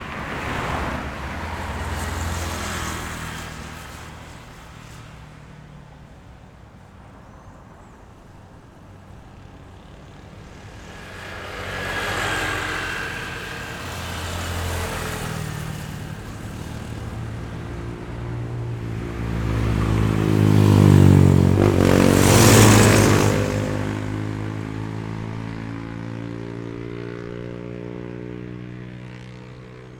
Soundscapes > Urban
VEHBy-Zh6 Road, car, motorcycle, bike pass by, highway, AM 070, in front of a restaurant at the junction between Manacapuru Novo Airão FILI URPRU
Veículos. Passando, atravessando estrada, perspectiva, AM-070 em frente ao entroncamento Novo Airão-Manacapuru. Veículos, carros, motos, motocicletas, caminhões, bicicletas. Gravado na AM-070, Amazonas, Amazônia, Brasil. Gravação parte da Sonoteca Uirapuru. Em stereo, gravado com Zoom H6. // Sonoteca Uirapuru Ao utilizar o arquivo, fazer referência à Sonoteca Uirapuru Autora: Beatriz Filizola Ano: 2025 Apoio: UFF, CNPq. -- Vehicles. Pass by, crossing road, perspective, recorded at the AM 070 highway, in front of a restaurant at the junction between Manacapuru and Novo Airão. Cars, trucks, motorcycles and bikes. Recorded at the road AM-070, Amazonas, Amazônia, Brazil. This recording is part of Sonoteca Uirapuru. Stereo, recorded with the Zoom H6. // Sonoteca Uirapuru When using this file, make sure to reference Sonoteca Uirapuru Author: Beatriz Filizola Year: 2025 This project is supported by UFF and CNPq.
brasil, highway, field-recording, pass-by, ambience, estrada, vehicle, soundscape, amazon, car, amazonia